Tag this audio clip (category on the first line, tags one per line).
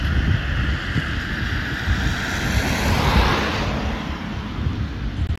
Soundscapes > Urban
traffic
vehicle
car